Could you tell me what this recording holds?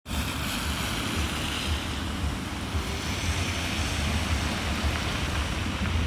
Sound effects > Vehicles
A car passing by from distance on Lindforsinkatu 2 road, Hervanta aera. Recorded in November's afternoon with iphone 15 pro max. Road is wet.
rain, tampere, vehicle